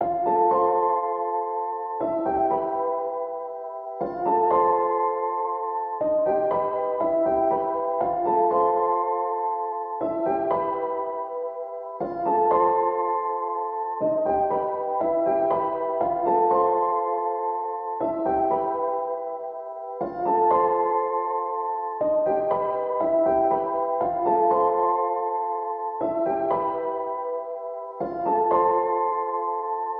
Music > Solo instrument
Piano loops 159 efect 4 octave long loop 120 bpm
Beautiful piano music . VST/instruments used . This sound can be combined with other sounds in the pack. Otherwise, it is well usable up to 4/4 120 bpm.
samples, reverb, 120, loop, music, simplesamples, piano, simple, pianomusic, free, 120bpm